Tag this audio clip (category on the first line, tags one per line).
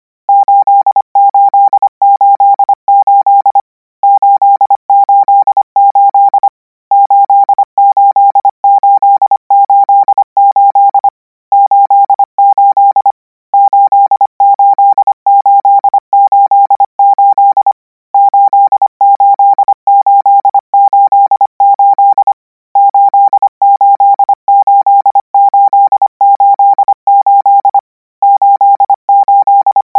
Sound effects > Electronic / Design
codigo
radio